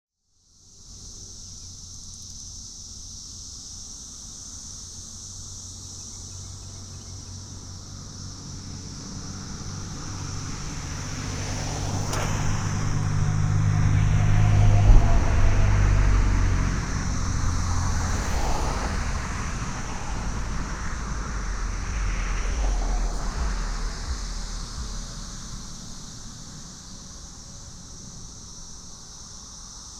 Soundscapes > Other
Kentucky Route 956 #1 Binaural
Traffic on Kentucky Route 956, recorded where it crosses Silver Creek, just north of Berea, Kentucky USA. Recorded on 5 June2025 beginning at 13:49. Various cars, trucks, etc. Birds, frogs (at the creek) and insects (mostly cicadas). Microphone was on the south side of the road pointing north across the road. Westbound traffic moves right to left. On the right, is a pavement transistion from blacktop (the road) to textured concrete (the bridge). Mic was a Josephson C700S. B format output was converted to binaural with Harpex, in post. Recorder was a Sound Devices MixPre 10 II. There is also a stereo version of this recording. Note on geolocation: At the time of uploading, the satellite photo shows the location in a field because the satellite photo used was taken before the extension of KY 956 was completed.
ambience, automobiles, birds, cars, field-recording, frogs, insects, traffic, trucks